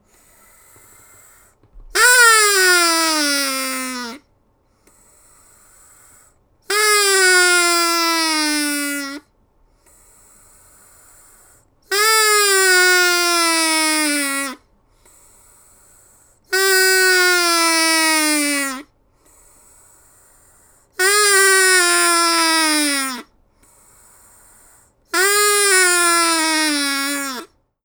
Sound effects > Animals
TOONVox-Blue Snowball Microphone, CU Duck Snoring Nicholas Judy TDC
A duck snoring.
Blue-brand, Blue-Snowball, cartoon, duck, snoring